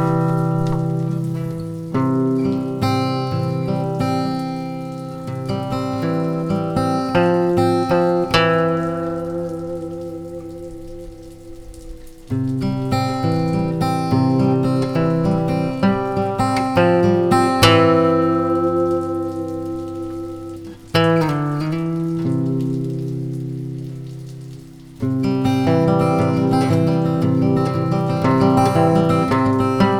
Music > Solo instrument
acoustic primitive guitar open C
acoustic primitive guitar in open c, recorded with a zoom h 1 in my home
acoustic, guitar, open-chords